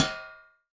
Sound effects > Objects / House appliances
Round baking tray old 2
A single-hit of an old, metal baking tray with a drum stick. Recorded on a Shure SM57.
hit, metallic, percussion, sngle-hit